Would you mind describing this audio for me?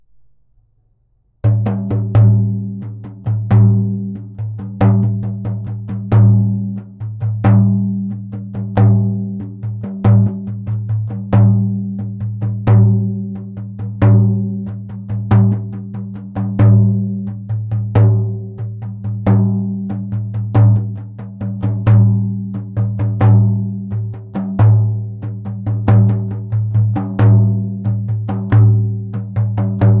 Music > Solo percussion
Solo-percussion of frame drum by Schlagwerk recorded on Pixel 6 Pro
drum, percussion